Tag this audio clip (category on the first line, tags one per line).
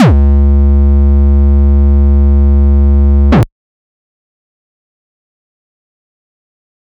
Instrument samples > Percussion
FX
percussion
game
8-bit